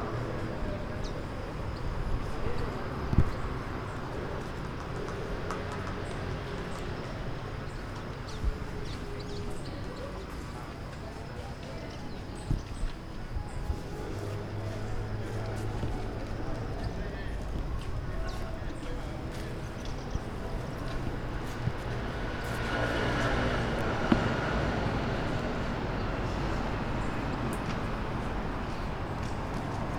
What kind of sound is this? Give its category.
Sound effects > Human sounds and actions